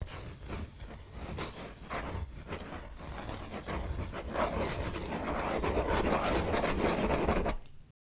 Sound effects > Objects / House appliances
Pencil scribble aggressively long
Pencil scribbles/draws/writes/strokes aggressively for a long time.
draw, pencil, write